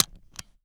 Sound effects > Vehicles
Ford 115 T350 - Seat-belt in
Van, Ford, 2025, 2003-model, SM57, A2WS, Old, FR-AV2, France, Ford-Transit, Vehicle, 115, T350, 2003, Single-mic-mono, Mono, August, Tascam